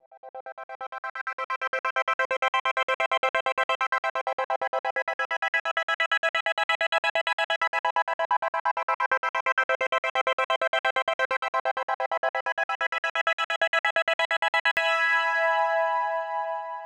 Music > Solo instrument
NEBULA PAD
This is a chord progression with the PAD sound called Nebula, this is a sample for electronic music with 130 bpm, it was processed with effect of 1/3 Bt Gate on the gross beat plugin.
electronic, pad, chords